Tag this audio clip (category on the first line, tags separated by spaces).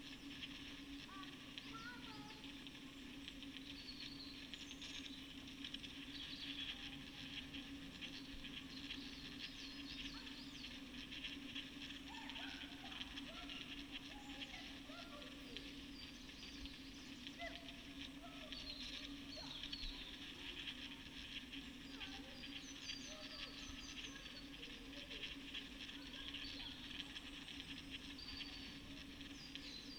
Soundscapes > Nature
raspberry-pi modified-soundscape nature sound-installation weather-data natural-soundscape field-recording alice-holt-forest Dendrophone artistic-intervention soundscape data-to-sound phenological-recording